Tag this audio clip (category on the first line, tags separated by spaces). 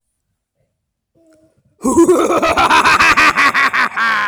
Speech > Other
Creepy Spooky Voices